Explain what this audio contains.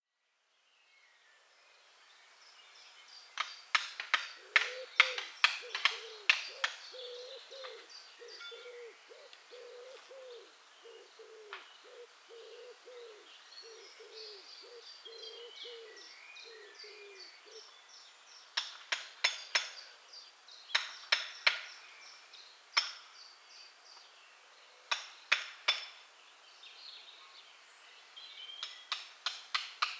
Sound effects > Other mechanisms, engines, machines
Someone shaping stone in a rural area of Wales